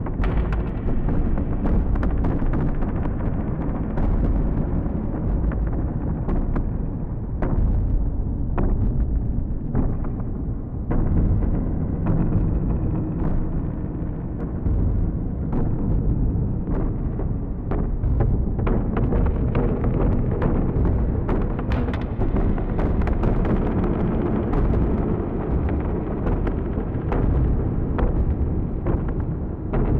Soundscapes > Synthetic / Artificial
Rumble of battle getting closer. Mix with ambient Karplus Strong sounds. Experimental Totally synthetic. Enjoy the rumbling!